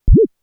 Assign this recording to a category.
Sound effects > Electronic / Design